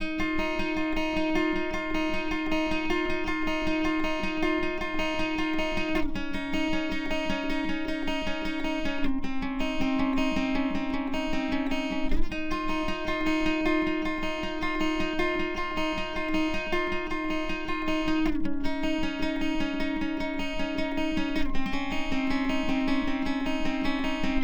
Music > Solo instrument
acoustic guitar sus chords 3
twang, chords, strings, acosutic, pretty, riff, string, knock, instrument, guitar, solo, chord, slap, dissonant